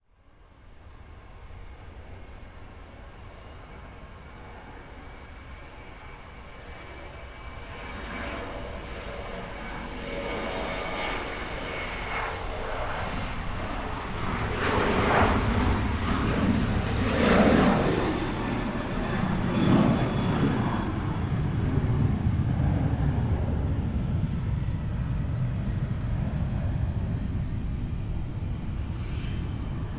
Soundscapes > Urban
Airport, Exterior, Orlando International (MCO) June 2024
Exterior, Orlando International Airport (MCO) 5 June 2024, around 7PM. Unprocessed stereo recording near a runway in the "Commercial Parking Lot" used by taxis, buses, and other shuttle services. You can hear passenger jets taking off and landing, some automobiles driving by, and taxi and uber drivers speaking in the background. Also some birds chirping along with other random sounds. The runways are oriented north to south. Microphones were facing east. I estimate the closest runway was about a mile away. That day the jets were approaching from the north and departing to the south. Edited to eliminate wind noise, but some remains. Volume raised. Otherwise, no EQ, Compression, or other processing was used. I would like to check it out.
Airport, Ambience, Exterior, Field-Recording, Passenger-jet